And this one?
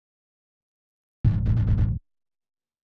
Instrument samples > Other
Guitar Riff
Beat, Electric, Guitar